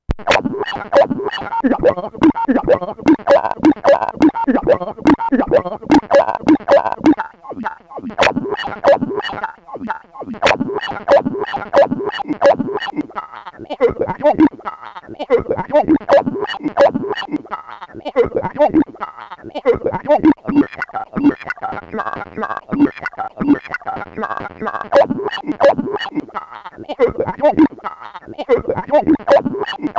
Music > Other
Janky speech (cleaned)
This is my circuit bent Whiz Kid Plus putting out some serious nice loops in patterns, almost like musical phrases. I have over 40 of these music pieces done by wiring my console up in the 'right' way for each one. MANY combinations don't make anything sensible or listenable!! Most of what you hear is being generated in real-time (there are real samples here and there) I love these because they don't sound like a Whiz Kid Plus AT ALL-very alien! Speech here is probably generated and NOT just read from the ROM...